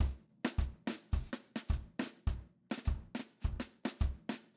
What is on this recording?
Music > Solo percussion
Short loop 105 BPM in 4
live, recording, loop